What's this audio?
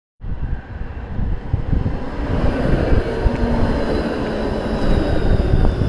Sound effects > Vehicles
A Tram passes by
A tram passing by. The sound was recorded at Hervanta (Tampere, Finland). The sound was recorded using Google pixel 6a microphone. No extra gear was used and no editing was performed. The sound was recorded for further classification model development, with a goal to classify vehichles by sound.
Passing, Tram-stop